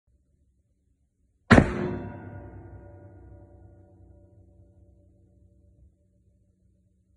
Sound effects > Human sounds and actions
kicking metal plate
metal and glasss plate I hit with a cup of plastic to make it sound like a kick. I made it for a college project.